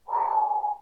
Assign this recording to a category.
Sound effects > Human sounds and actions